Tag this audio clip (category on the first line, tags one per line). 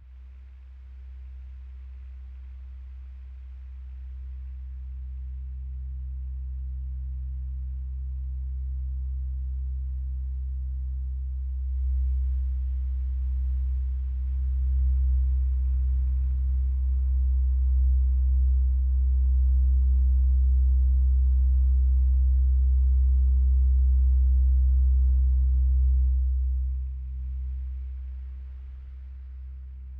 Sound effects > Objects / House appliances
Tascam
Sennheiser
moving-mic
hum
mobile-mic
Fan
sound-exploration
air
Shotgun-microphone
humm
MKE-600
Shotgun-mic
FR-AV2
buzz
Hypercardioid
Single-mic-mono
noise
MKE600